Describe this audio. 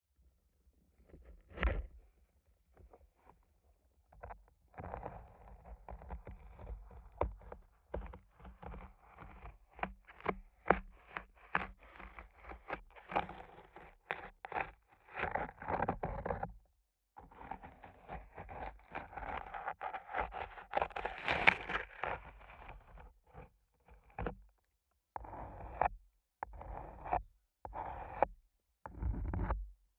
Sound effects > Other
Fingers scratching a piece of bark at various speeds using a contact mic.
bark, contact, fingers, mic, scratching